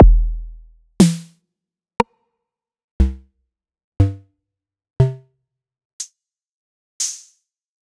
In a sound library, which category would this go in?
Instrument samples > Percussion